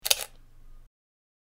Sound effects > Other
Leica M10 Shutter Sound
The sound of a Leica M10 Shutter recorded with an iPhone 14 Pro
Shutter, Sound, M10, Camera, Leica